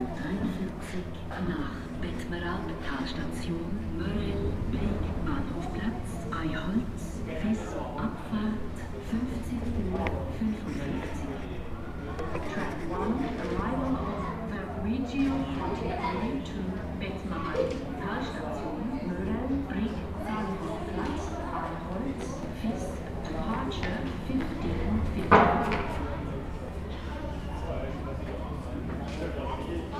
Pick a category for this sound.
Soundscapes > Urban